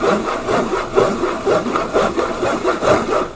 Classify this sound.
Sound effects > Objects / House appliances